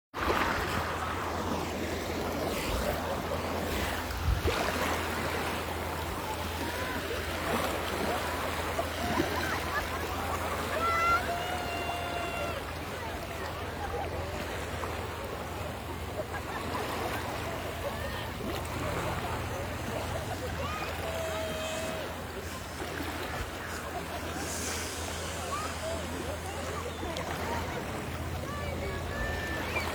Soundscapes > Nature
The Sea at Beresford part II
A walk along the shore line at low tide. People are wading in the water, children are laughing and very little wind.
beach, breaking-waves, coast, field-recording, lapping, ocean, sea, seaside, shore, surf, water, waves